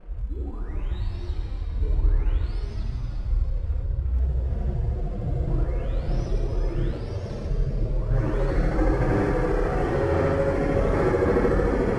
Sound effects > Electronic / Design
Murky Drowning 3
This is a dark pad and ambient pack suitable for sci-fi, horror, mystery and dark techno content. The original 20 samples were made with Waldorf PPG Wave 2.2 vst, modeled after the hardware synth. They include both very high and very low pitches so it is recommended to sculpt out their EQ to your liking. The 'Murky Drowning' samples are versions of the original samples slowed down to 50 BPM and treated with extra reverb, glitch and lower pitch shifting. The, 'Roil Down The Drain' samples are barely recognizable distorted versions of the original samples treated with a valve filter and Devious Machines Infiltrator effects processor. The, 'Stirring The Rhythms' samples were made by loading up all the previous samples into Glitchmachines Cataract sampler.